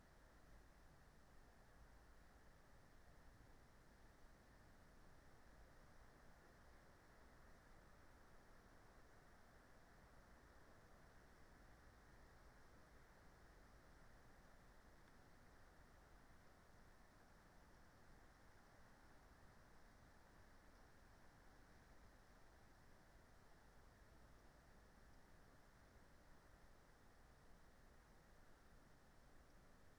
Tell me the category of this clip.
Soundscapes > Nature